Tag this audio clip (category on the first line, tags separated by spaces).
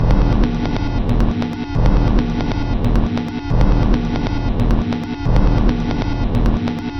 Instrument samples > Percussion
Ambient Drum Industrial Packs Samples Soundtrack Underground Weird